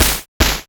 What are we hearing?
Instrument samples > Percussion
Synthed with phaseplant only. Processed with Khs Bitcrusher, Khs Phase Distortion, Khs Clipper, Khs 3-band EQ, Waveshaper.